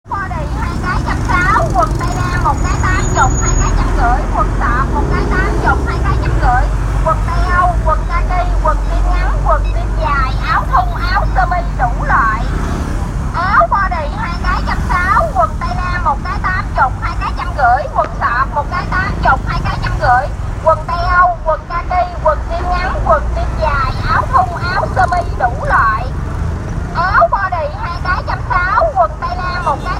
Speech > Solo speech
Áo Bo Đi, 2 Cai 160; Quần Tây An 1 Cái 80, 2 Cai 150; Quần Sọt 1 Cái 80, 2 Cái 150;
Woman sell clothes say 'Áo bo đi, 2 cai 160; quần Tây An 1 cái 80, 2 cái 150; quần sọt 1 cái 80, 2 cái 150; quần Tây Âu, Quần kaki, quần jean ngán, quần jean dài, áo thun, áo somi đủ loại. Record ué iPhone 7 Plus smart phone 2026.01.15 17:28
business, clothes, female, sell, viet, vioce, woman